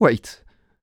Speech > Solo speech
Fear - Waiit
Vocal, Male, NPC, talk, Single-take, Mid-20s, Man, oneshot, singletake, fear, dialogue, FR-AV2, Human, U67, Video-game, Voice-acting, Tascam, wait, Neumann, voice